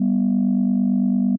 Instrument samples > Synths / Electronic
Landline Phonelike Synth G4
Old-School-Telephone, Holding-Tone, JI-Third, JI-3rd, Landline, JI, Landline-Phonelike-Synth, just-minor-third, Landline-Holding-Tone, Landline-Telephone, Landline-Phone, just-minor-3rd, Landline-Telephone-like-Sound, Synth, Tone-Plus-386c